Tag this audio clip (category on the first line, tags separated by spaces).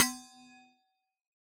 Sound effects > Objects / House appliances
percusive recording sampling